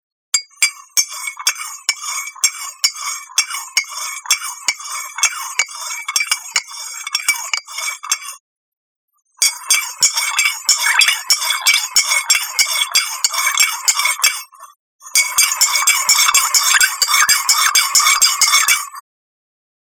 Sound effects > Objects / House appliances
stirring-liquid-in-cup
A spoon swirling liquid inside a cup. Recorded with Zoom H6 and SGH-6 Shotgun mic capsule.
stirring, liquid, kitchen, stir, mug